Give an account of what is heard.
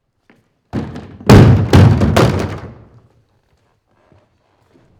Sound effects > Objects / House appliances
OBJHsehld trashcan knocked Fallen
Empty trash can knocked over.
can, garbage, knocked, over, Trash